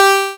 Instrument samples > Synths / Electronic
[BrazilFunk] Lead One-shot 1-G Key
Synthed with phaseplant only. Processed with Fruity Limiter and ZL EQ. To use it better, just do these below: 1. Put it into FLstudio sampler and stretch mode select ''Stretch''. 2. Click the wrench iconic, right click the piano G5 key. 3. Pogo amount use right 20%. 4.Then you can toma toma!!!
Distorted, BrazilFunk, Lead, BrazilianFunk